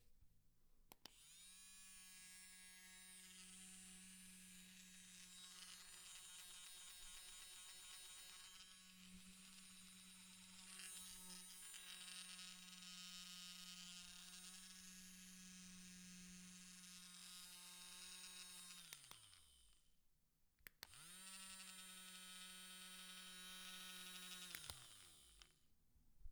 Sound effects > Other mechanisms, engines, machines
milk frother spinning
A milk frother being spun. I used this for robot actuators, rotors, as well as a boombox turning on.
electric, mechanical, milkfrother, motor, spin, spinning, vibration, whir, whirring